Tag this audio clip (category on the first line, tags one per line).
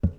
Sound effects > Objects / House appliances
plastic
drop
clatter
lid
bucket
scoop
foley
pail
cleaning
container
debris
slam
metal
tool
shake
clang
object
carry
spill
tip
fill